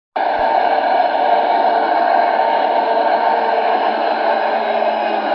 Sound effects > Vehicles
tram driving by2
Sound of a a tram drive by in Hervanta in December. Captured with the built-in microphone of the OnePlus Nord 4.
field-recording, track, traffic, tram